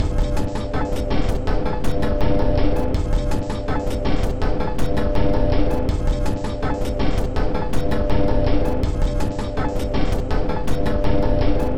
Instrument samples > Percussion
This 163bpm Drum Loop is good for composing Industrial/Electronic/Ambient songs or using as soundtrack to a sci-fi/suspense/horror indie game or short film.
Underground, Industrial, Weird, Dark, Loop, Ambient, Packs, Loopable, Soundtrack, Drum, Alien, Samples